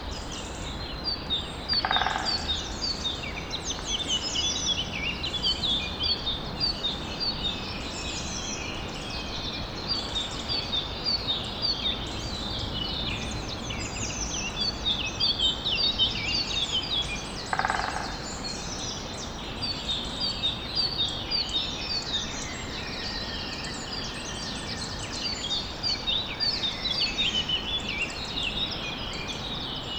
Soundscapes > Nature
Alpine River Valley Dawn Chorus - Woodpecker
A dawn chorus recorded in late March in the Romanche Valley, near Bourg d'Oisans in the Isère Alps. Featured along with the songs of a variety of birds, is a woodpecker doing its work periodically. Also heard are the nearby Romanche and Vénéon rivers. Recorded using a pair of Sennheiser MKH8040s in ORTF arrangement.
bird-song, bird, valley, woodpecker, birdsong, forest, spring, morning, birds, ambience, alps, nature, woodpeckers, field-recording, dawn-chorus